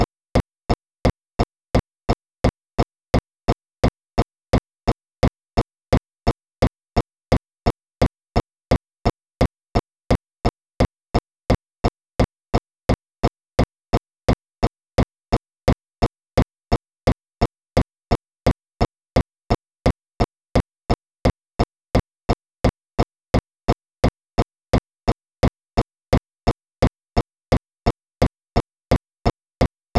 Sound effects > Vehicles
VEHMech-CU Turn Signal, Looped Nicholas Judy TDC
A car turn signal. Looped.
car, loop, Phone-recording, turn-signal